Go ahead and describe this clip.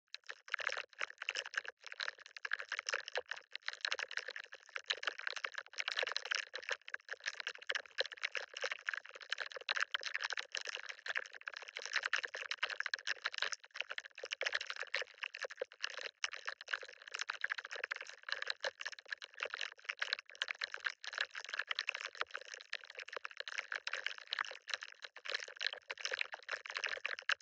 Sound effects > Electronic / Design
All samples used from: TOUCH-LOOPS-VINTAGE-DRUM-KIT-BANDLAB. Processed with KHS Filter Table, KHS Convolver, Vocodex, ZL EQ and Fruity Limiter.